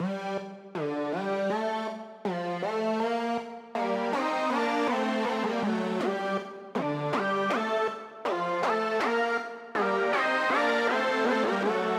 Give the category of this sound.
Music > Solo instrument